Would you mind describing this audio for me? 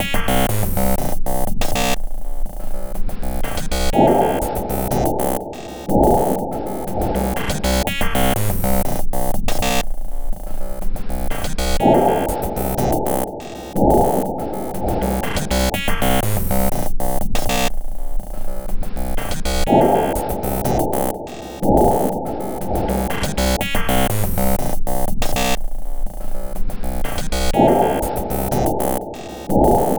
Instrument samples > Percussion
This 61bpm Drum Loop is good for composing Industrial/Electronic/Ambient songs or using as soundtrack to a sci-fi/suspense/horror indie game or short film.
Samples, Soundtrack, Loopable, Packs, Weird, Ambient, Loop, Dark, Underground, Drum, Alien, Industrial